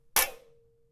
Sound effects > Other mechanisms, engines, machines
Handsaw Oneshot Metal Foley 24
foley, fx, handsaw, hit, household, metal, metallic, perc, percussion, plank, saw, sfx, shop, smack, tool, twang, twangy, vibe, vibration